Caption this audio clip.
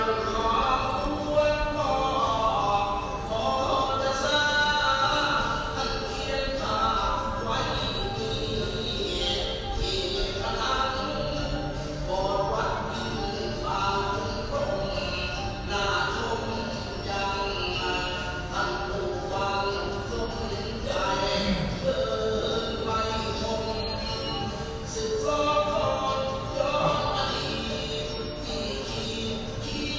Soundscapes > Urban
Temple Music, Bangkok, Thailand (Feb 21, 2019)
Ambient music recorded at a temple in Bangkok, Thailand. Includes background music played in the temple.